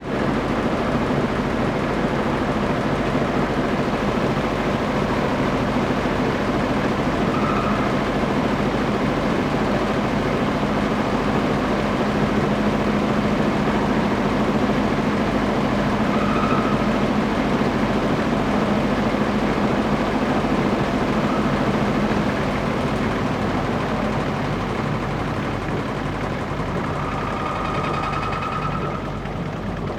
Sound effects > Objects / House appliances
MACHAppl Squeaking Spin Cycle
Domestic washing machine in a small household laundry. Squeaky spin cycle.
cycle, laundry, machine, motor, sfx, spin, squeaky, wash, washing, washing-machine